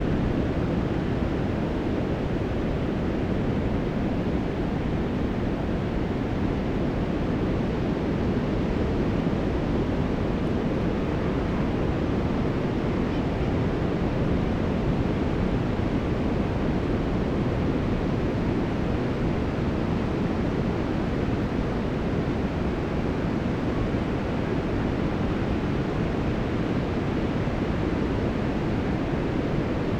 Soundscapes > Nature
Manzanita, 03/2025, waves from atop the dunes, beach ambiance
Waves from higher up, some beach goer ambiance, dog barking at me at 1:05
waves, passerby, dog, manzanita, wind, beach, oregon, water, field-recording, barking, ocean